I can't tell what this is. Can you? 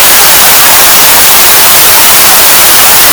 Sound effects > Electronic / Design
3 second static sound. This was made by recording a blank recording in Scratch game engine and raising the volume, and creating a static sound.
static, tv, effect, device, sound, electronic, radio, television, electric, noise